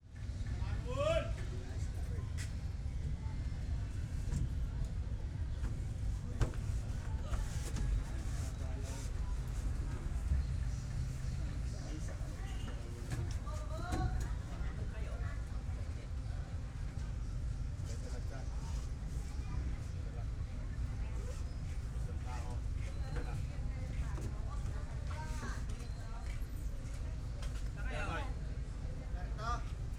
Soundscapes > Other
250722 095858 PH Travelling in a jeepney
Travelling in a jeepney (binaural, please use headset for 3D effects). I made this recording while sitting on the bench at the right side of a jeepney (with the rear of the vehicle on my left, and the front on my right), travelling from Calapan city to Puerto Galera, through the province of Oriental Mindoro (Philippines). At the beginning of the file, one can hear the atmosphere of the jeepney station while I’m waiting that the vehicle will go. At #0:42, the driver starts the engine and the trip begins. One can hear the noise from the vehicle and the traffic while we travel through the street of the city, as well as the voices of the passengers talking with each others. Then, at about #17:50, the vehicle will stop for around 10 minutes at a place called Singko, waiting for additional passengers. One can hear the traffic around, as well as the conversations between people in the vehicle.
atmosphere, binaural, Calapan-city, field-recording, jeep, motorcycles, Oriental-Mindoro, Philippines, traffic, vehicles